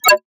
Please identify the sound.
Sound effects > Electronic / Design
Random UI Sounds 1
All samples used from FL studio original sample pack, I was tried to make a hardstyle rumble, but failed, I put it into vocodex because I was boring, then I got this sound.
Click, Effect, Game, UI